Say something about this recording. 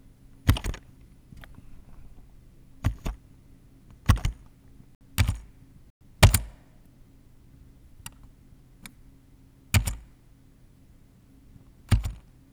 Sound effects > Objects / House appliances
FUJITSU Computers Keyboard - key Press Mono Multi-take
Close-up, H2N, individual-key, Key, Keyboard, key-press, Zoom-Brand, Zoom-H2N